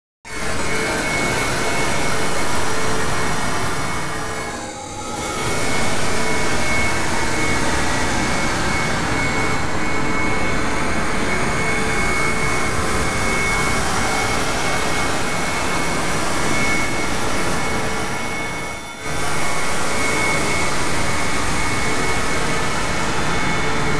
Soundscapes > Synthetic / Artificial
Down The Grain 3
effects, electronic, experimental, free, glitch, granulator, noise, packs, royalty, sample, samples, sfx, sound, soundscapes